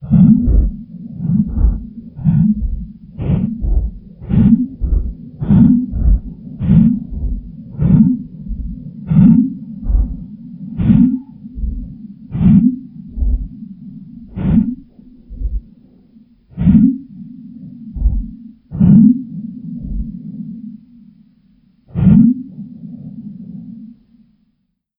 Sound effects > Experimental
Creature's Last Breath
A monster's dying last breaths. Recorded making pops with my mouth, slowed down, paulstretched, levelled in Audacity.
agony, alien, breath, collapse, creature, dark, death, decay, despair, dying, eerie, exhale, fading, fear, final, gasp, grim, groan, haunting, horror, killing, last-breath, monster, pain, rasp, slow, struggle, suffocating, terror, wheeze